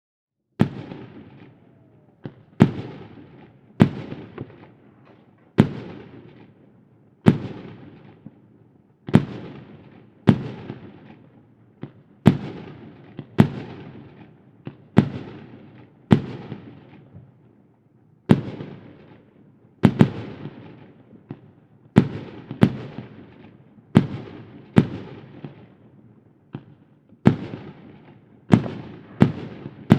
Sound effects > Human sounds and actions
New Year's Eve and vintage microphones Tascam DR680 Mk2 and two stereo vintage microphones: - National Panasonic RP-8135 - Grundig GDSM 200